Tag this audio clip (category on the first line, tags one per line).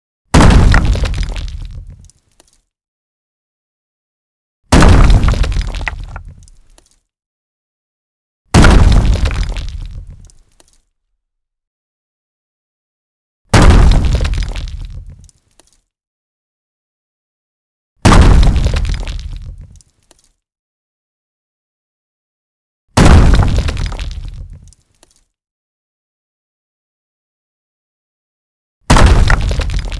Sound effects > Natural elements and explosions
blast
boulder
Concrete
crater
explosive
impact
impactful
rocks